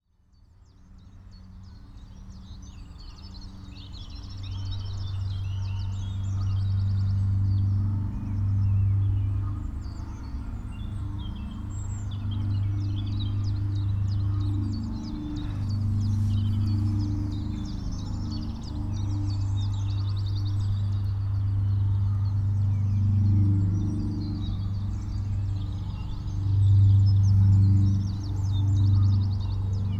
Soundscapes > Nature
A recording at Wolseley Nature reserve.
nature, plane, distant, wildlife, recordings, birds